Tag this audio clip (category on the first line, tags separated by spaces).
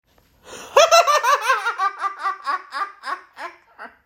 Sound effects > Human sounds and actions
evil joker laugh